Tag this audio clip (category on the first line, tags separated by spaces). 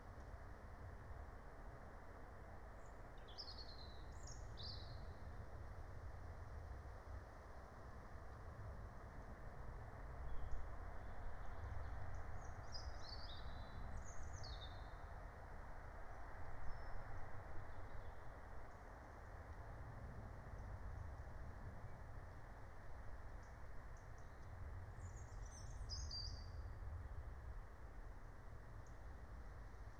Soundscapes > Nature
meadow raspberry-pi nature field-recording phenological-recording alice-holt-forest natural-soundscape soundscape